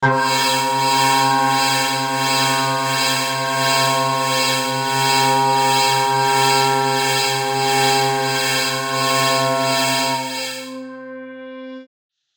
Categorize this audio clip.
Instrument samples > Synths / Electronic